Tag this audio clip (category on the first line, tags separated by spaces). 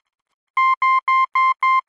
Sound effects > Electronic / Design
Language Morse Telegragh